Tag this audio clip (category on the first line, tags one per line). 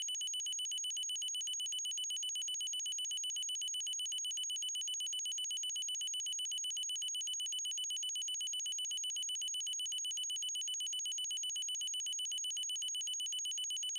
Sound effects > Electronic / Design
alert
alert-sound
computer-alert
computer-siren
critical-damage-alarm
critical-failure-sound
damage-sustain-alert
dylan-kelk
emergency-alarm
emergency-alarm-loop
heavy-damage-alert
intense-computer-alert
loop
low-health-sound
low-hp-alarm
low-hp-sound
low-shields-alarm
perimeter-breach
warning-klaxxon